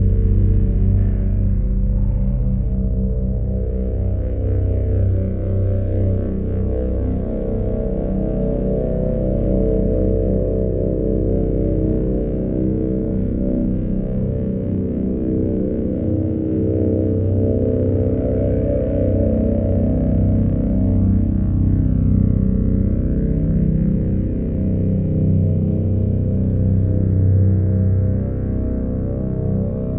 Music > Other
A dark, encompassing sound created by the_odds, altered via downsampling and slight effects processing. Enjoy!